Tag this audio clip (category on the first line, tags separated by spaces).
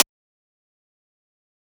Instrument samples > Percussion
FX 8-bit percussion